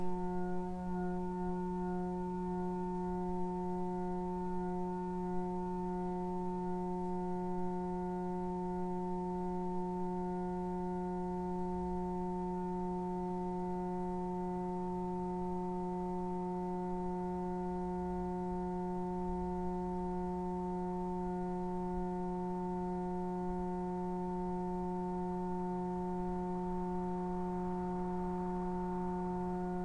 Sound effects > Other mechanisms, engines, machines
Electrical hum in the entrance at night
Hum of electrical origin in the entrance at night. Unknown equipment, possibly broken exhaust fan in the Internet provider's equipment. Main frequencies: 176, 365, 906 Hz, 2 kHz. Recorder: Tascam DR-40. XY.
hum, electric, noise